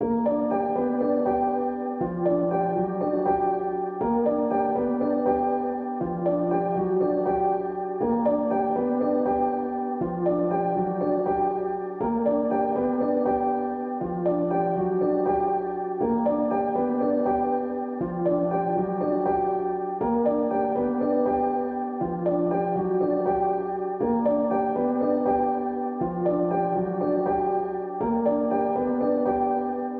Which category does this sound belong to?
Music > Solo instrument